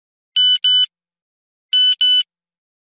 Sound effects > Objects / House appliances
Nokia 3310 SMS tone recorded from old phone by me